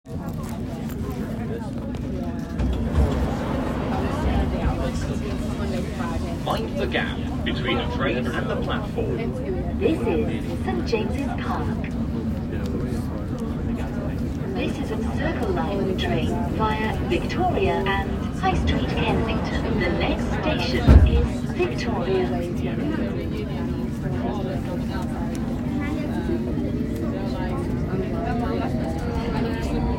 Soundscapes > Urban
London Tube Sounds
Recorded this on the tube back home, you'll hear the iconic London's underground chaos.
Announcement, Record, Station, Tube, Urban